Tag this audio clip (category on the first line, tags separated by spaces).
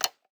Sound effects > Human sounds and actions
click off